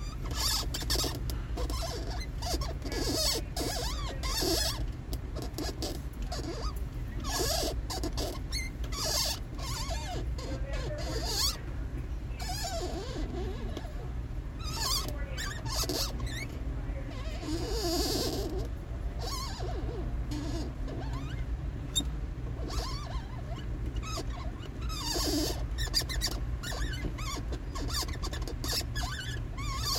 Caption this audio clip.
Sound effects > Objects / House appliances
TOONSqk-Samsung Galaxy Smartphone, CU Squeaks, Comical, From Grab Handle Of Car Nicholas Judy TDC
Comical squeaks from the grab handle of a car.
car, cartoon, comical, grab, handle, Phone-recording, squeak, vehicle